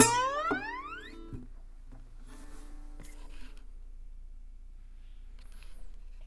Music > Solo instrument
acoustic guitar slide6
acosutic, chord, chords, dissonant, guitar, instrument, knock, pretty, riff, slap, solo, string, strings, twang